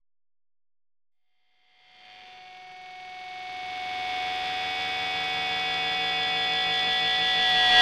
Sound effects > Electronic / Design
HARMONIC INVITATION
sound-effect, soundeffect, effect, uplift, synthesis, uplifter, sweep